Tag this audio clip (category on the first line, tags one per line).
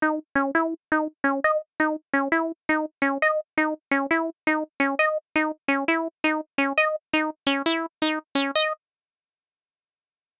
Music > Solo instrument
Roland
electronic
TB-03
hardware
techno
house
303
Acid
Recording
synth